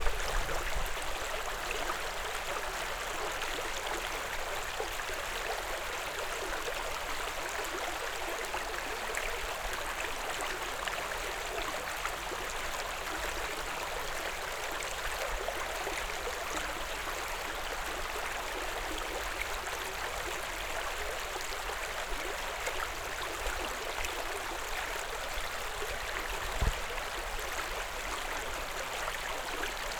Soundscapes > Nature
FX River 01
Recorded at Jacobsburg State Park in PA.
babbling, brook, creek, flowing, river, stream, water